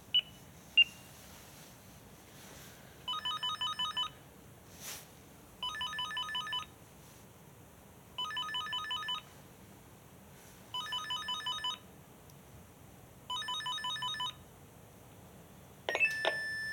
Sound effects > Objects / House appliances
building security dial original
Recorded with my phone.
apartments, click, beep, dial, button, intercom, boop